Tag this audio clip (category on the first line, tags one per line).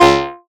Instrument samples > Synths / Electronic
additive-synthesis
bass
fm-synthesis